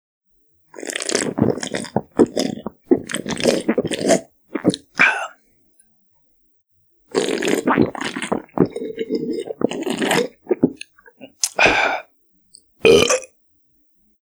Sound effects > Human sounds and actions
I chug some sparkling water very loudly out of a can. Loud burp at the end. I did not clean it up, I leave that to you to do in your preferred method! Recorded from my H1essential Handy Recorder
can, swallow, cola, coke, water, chugging, drink, sparkiling, chug, sip, soda, potion